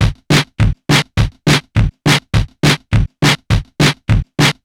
Instrument samples > Percussion
punk rocker beat-103bpm
rock your socks off beat
drumloop, drums, drumbeat